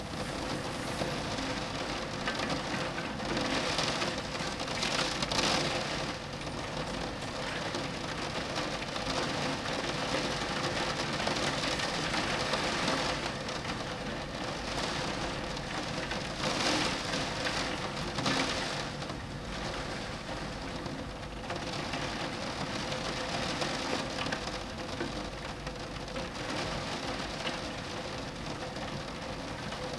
Soundscapes > Nature
Moderate raindrops against a window, clear and repetitive pattern.
wet, weather